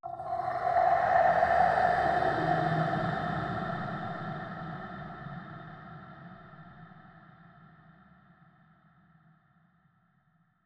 Sound effects > Experimental
Creature Monster Alien Vocal FX-37
Growl, Frightening, gutteral, Echo, evil, scary, Sound, Animal, demon, Monster, Vocal, Snarl, visceral, Vox, Groan, Sounddesign, Otherworldly, Reverberating, Monstrous, Deep, Ominous, sfx, Alien, fx, Creature, boss, Fantasy, gamedesign, Snarling, devil